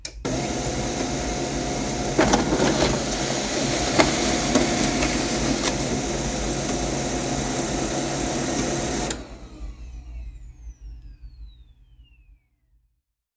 Sound effects > Objects / House appliances
MACHOffc-Samsung Galaxy Smartphone, CU HP LaserJet Pro Printer, Printing Nicholas Judy TDC
An HP LaserJet Pro printer printing.
hp, laserjet, Phone-recording, print, printer